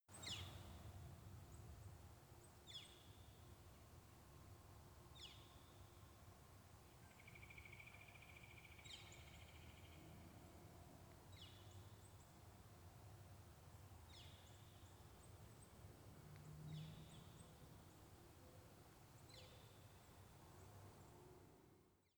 Soundscapes > Nature
Various Birds - Includes Northern Flicker, Red-bellied Woodpecker, and Distant Mourning Dove
An LG Stylus 2022 was used to records three different birds, as shown in the title. The red-bellied woodpecker starts at 0:07.
bird, birds, birdsong, dove, flicker, mourning, nature, woodpecker